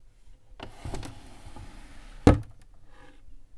Sound effects > Objects / House appliances
Wooden Drawer 03
open, drawer, wooden